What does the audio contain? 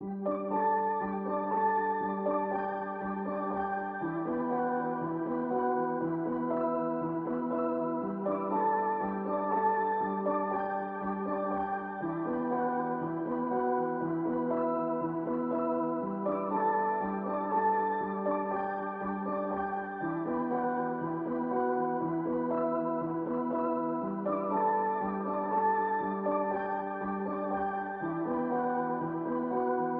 Music > Solo instrument
Piano loops 197 efect 2 octave long loop 120 bpm
simple, samples, free, 120, pianomusic, piano, loop